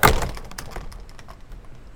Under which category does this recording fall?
Sound effects > Objects / House appliances